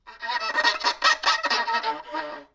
Instrument samples > String
Bowing broken violin string 13
Bowing the string(s) of a broken violn with a cello bow.
beatup, bow, broken, creepy, horror, strings, uncomfortable, unsettling, violin